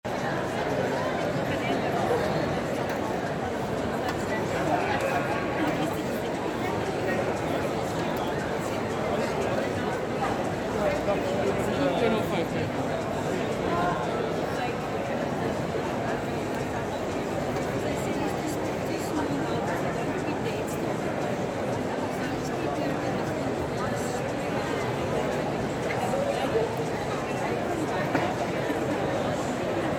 Sound effects > Human sounds and actions
ICC CROWD IN MAIN HALL AT INDABA .... 2025
Recorded inside the main exhibition hall at the 2025 South African Travel Indaba .
crowd, Expo, hall, people